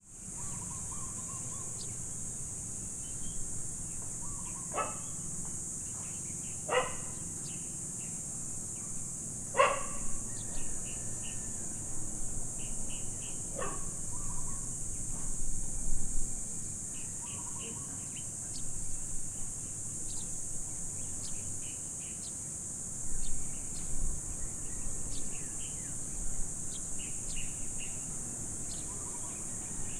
Soundscapes > Urban
250731 084650 PH Calm morning in a Filipino suburb
Calm morning in a Filipino suburb, with cicadas. I made this recording during a calm morning, from the terrace of a house located at Santa Monica Heights, which is a costal residential area near Calapan city (oriental Mindoro, Philippines). One can hear predominant cicadas, the neighbour’s dog barking sometimes, some birds chirping, as well as distant traffic hum, and few human activities. Recorded in July 2025 with a Zoom H6essential (built-in XY microphones). Fade in/out applied in Audacity.